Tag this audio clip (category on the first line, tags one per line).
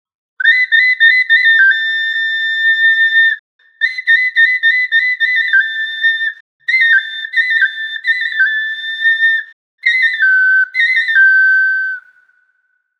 Instrument samples > Wind
etnico
flauta
nativo
prehispanico